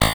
Sound effects > Electronic / Design
RGS-Glitch One Shot 17
One-shot, FX, Noise, Effect, Glitch